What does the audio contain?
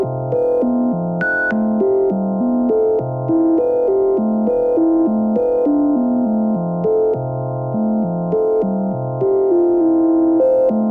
Soundscapes > Synthetic / Artificial
April 4th 8 o'clock
Morning forest sonification from April 4th, 2025 (08:00), with pitch shaped by air temperature and CO₂, rhythm from sunlight, vibrato from radiation, and tonal color from wind and humidity.
PureData, Climate, Sonification, SensingtheForest